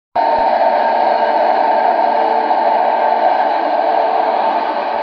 Sound effects > Vehicles
tram driving by3
Sound of a a tram drive by in Hervanta in December. Captured with the built-in microphone of the OnePlus Nord 4.
traffic, tram, track, field-recording